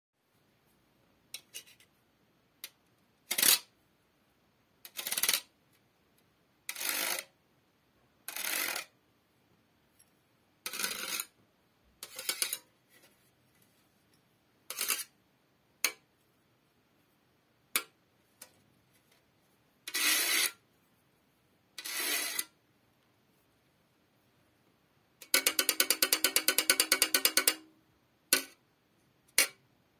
Sound effects > Other
Scraping a Grater with a Fork
Scraping and tapping on a cheese grater with a fork using different speed, force or texture of the grater each time.
experiment, knock, machine, mechanics, metal, metallic, noise, rake, rummage, scraping, sound-effect, sweep, sweeping, tap, tapping, versatile